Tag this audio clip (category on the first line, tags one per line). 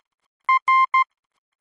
Sound effects > Electronic / Design
Language
Morse